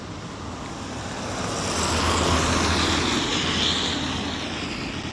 Sound effects > Vehicles
Car-passing 19
car, drive, engine, hervanta, outdoor, road, tampere